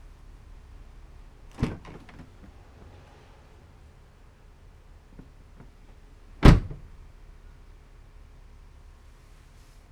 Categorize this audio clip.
Soundscapes > Other